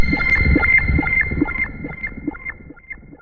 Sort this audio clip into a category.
Instrument samples > Synths / Electronic